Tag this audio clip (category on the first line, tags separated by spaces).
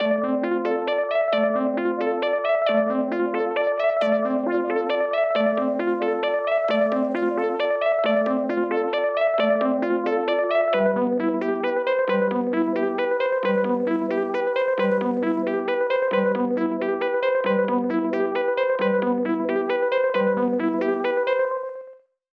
Music > Solo instrument
analog arp synth loop arpeggio tape melodic 1lovewav